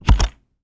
Sound effects > Objects / House appliances
FUJITSU Computers Keyboard - Enter key Press Mono
Subject : A all white FUJITSU keyboard key being pressed. Date YMD : 2025 03 29 Location : Thuir Theatre, South of France. Hardware : Zoom H2N, MS mode. Using the middle side only. Handheld. Weather : Processing : Trimmed and Normalized in Audacity.
Close-up, H2N, individual-key